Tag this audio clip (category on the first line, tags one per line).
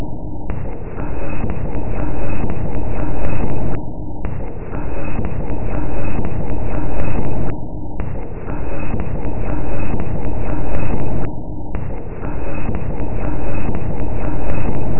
Instrument samples > Percussion
Alien
Ambient
Dark
Drum
Industrial
Loop
Loopable
Packs
Samples
Soundtrack
Underground
Weird